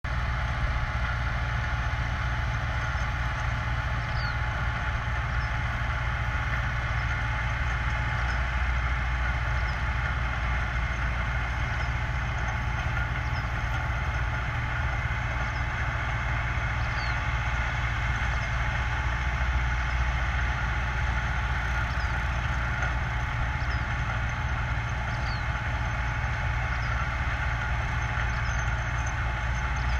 Soundscapes > Nature
Drilling next door 09/02/2021
Attempt to drill for new water next door